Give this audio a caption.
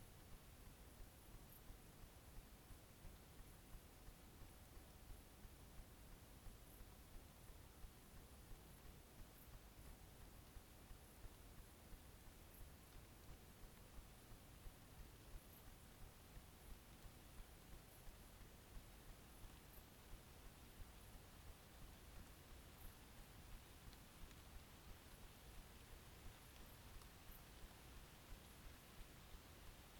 Soundscapes > Nature
2025 09 08 01h55 Gergueil in combe de poisot - Vorest valley ambience
Subject : Ambience recording of the forest. Inside a "combe" (bottom of valley) forest. Microphone was under a bolder/rock with a tree ontop a few meters from the path. Date YMD : 2025 September 08, 01h55 Location : Gergueil 21410 Bourgogne-Franche-Comté Côte-d'Or France. Hardware : Zoom H2n XY mode. Small rig magic arm. Weather : Rain. Processing : Trimmed and normalised in Audacity. Zoom was set at 6gain, added a little in post. Notes : Nothing of significance, I don't hear many animals sadly. There is a thunderstorm and heavy rain in the recording.
21410, ambiance, ambience, combe, Cote-dor, country-side, field-recording, forest, France, Gergueil, H2n, nature, night, rural, Tascam, valley, XY, Zoom